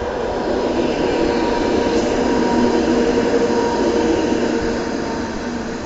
Soundscapes > Urban
Passing Tram 18
urban, trolley, city, field-recording, traffic, street, outside, tram